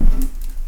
Sound effects > Other mechanisms, engines, machines
shop foley-002
bang, boom, bop, crackle, foley, fx, knock, little, metal, oneshot, perc, percussion, pop, rustle, shop, sound, strike, thud, tink, tools, wood